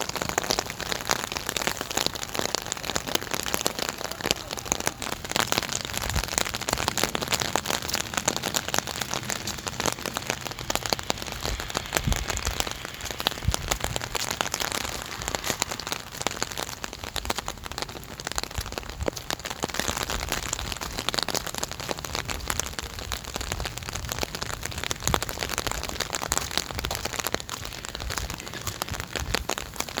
Soundscapes > Nature
RAINClth-CU On Umbrella Nicholas Judy TDC
Raining under an umbrella.
rain, under, umbrella